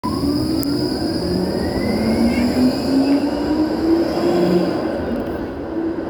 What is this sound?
Sound effects > Vehicles
20tram toleaveintown
A tram is leaving the tramstop and speeding up. Recorded in Tampere on a samsubg phone.